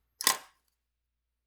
Sound effects > Objects / House appliances
aluminum can foley-010
household, alumminum, metal, scrape, can, sfx, fx, tap, foley, water